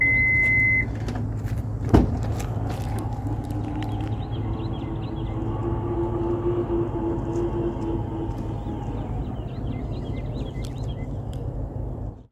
Sound effects > Vehicles
VEHMech-Samsung Galaxy Smartphone, CU Automatic Trunk, Open Nicholas Judy TDC
An automatic trunk opening. Birdsong in background.
car, Phone-recording, trunk, automatic, open